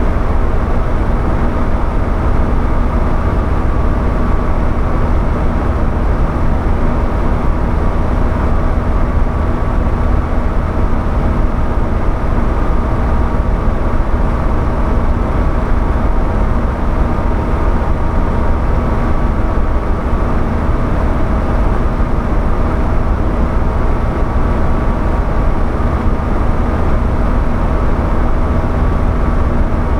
Sound effects > Objects / House appliances
Air Conditioner 01
I placed a Zoom H4N multitrack recorder on top of an air conditioner while in operation. The sounds captured in this recording are of when the AC unit is on, set to "Max".
air, compressor, machinery, moving, operating